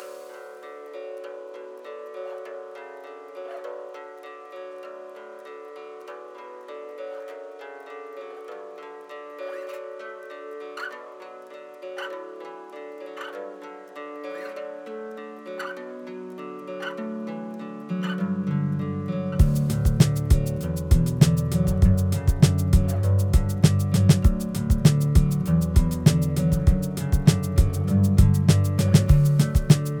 Music > Multiple instruments
Summer [Guitar Hip-Hop Beat]
This is a simple beat made with my Martin X Series acoustic guitar and some drum samples from Jake Reed's "Super Drums 2". The guitar was captured from my Audio Technica AT2035 Microphone that is plugged into my Yamaha MG10XU 4 track mixer. The track is 99 beats per minute and is supposed to give off sort of a chill, uplifting, energetic "Summer" vibe.
99bpm, acoustic-guitar, beat, guitar, loop, music, song